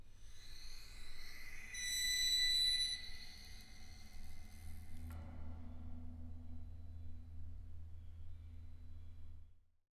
Sound effects > Other
Bowing metal stairs with cello bow 3
Bowing the metal part of the staircase in our apartment building. It's very resonant and creepy.